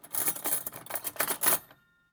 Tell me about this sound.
Sound effects > Objects / House appliances
forks handling4
cutlery, kitchen, forks, silverware, foley